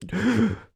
Sound effects > Human sounds and actions
Noises - Unhealthy deep breath
Male, talk, Neumann, inhale, dialogue, NPC, U67, affraid, scared, Tascam, Voice-acting, Vocal, Video-game, Mid-20s, Human, voice, breathing-in, FR-AV2, frightened, Man, Single-take, singletake, oneshot, breathing